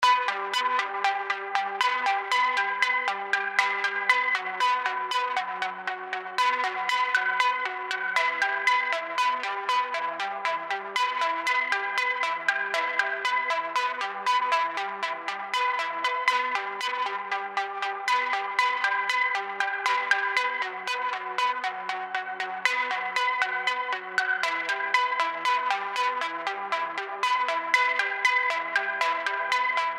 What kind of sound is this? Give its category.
Music > Other